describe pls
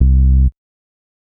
Instrument samples > Synths / Electronic
syntbas0018 C-krobw
bass, vsti